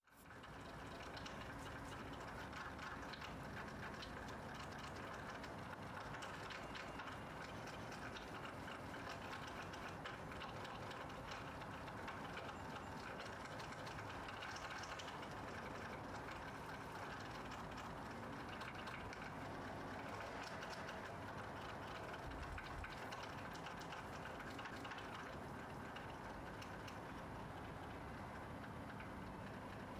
Soundscapes > Nature
Summer midnight rain
Raindrops on the window/metal roof at 4:44am in Seattle, WA.
raining, drops, drip, water, dripping, weather, wet, raindrop, raindrops, droplets, field-recording, shower, rain